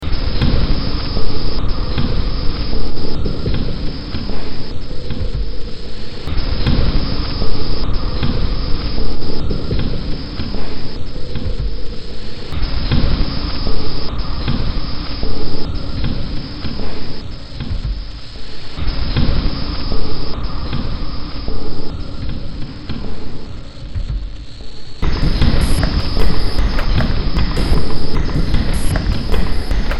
Music > Multiple instruments
Demo Track #3163 (Industraumatic)
Sci-fi, Soundtrack, Industrial, Games, Horror, Cyberpunk